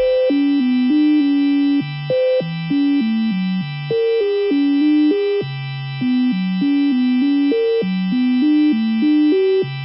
Soundscapes > Synthetic / Artificial
Extreme High Air Temperature
Sonification focusing on extreme high temperature, with pitch pushed to the upper range to reflect heat intensity.
Climate, Sonification, SensingtheForest, PureData